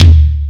Instrument samples > Percussion
A floor 1 tom (not the floor 2; a lightfloor and not a deepfloor).